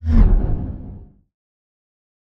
Sound effects > Other
Sound Design Elements Whoosh SFX 049
motion, production, elements, audio, transition, movement, cinematic, element, trailer, effect, film, fx, sound, swoosh, effects, design, ambient, whoosh, fast, dynamic, sweeping